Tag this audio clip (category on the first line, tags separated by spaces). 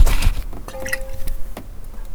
Sound effects > Objects / House appliances
fieldrecording natural stab sfx industrial foley object foundobject perc oneshot bonk fx hit percussion drill glass clunk mechanical metal